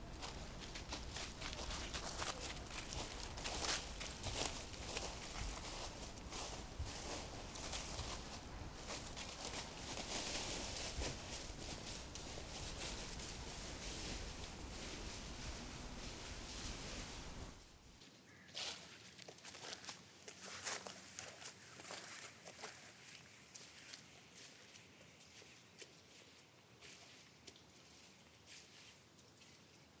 Sound effects > Human sounds and actions
walking slow mono
Walking slowly in the forest in mono
slow, walk, forest, mono